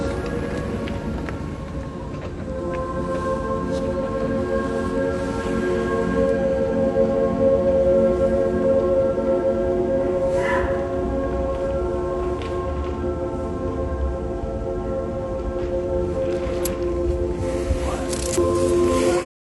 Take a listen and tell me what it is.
Sound effects > Vehicles
Electric car sound that alerts pedestrians of its presence
car, vehicle, automobile, electric